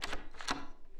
Sound effects > Objects / House appliances
Sound of a door handle Recorded with a Rode NT1 Microphone
lock, generic, handle, door